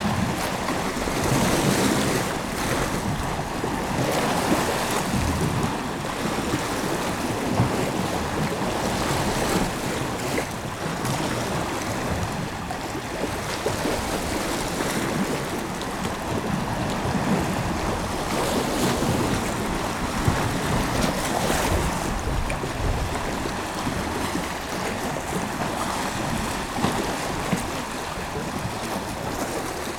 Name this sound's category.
Soundscapes > Nature